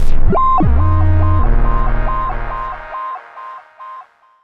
Instrument samples > Synths / Electronic
CVLT BASS 5
synth, stabs, wobble, wavetable, synthbass, low, clear, lowend